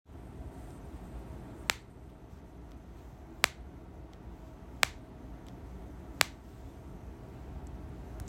Sound effects > Human sounds and actions
close AirPods case sound
closing the airpods case sound
case, close, airpods